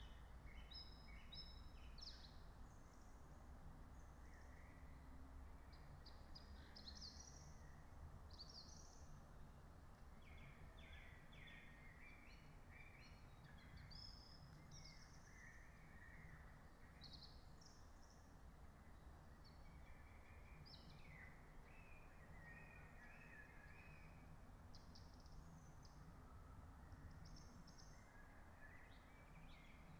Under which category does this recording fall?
Soundscapes > Nature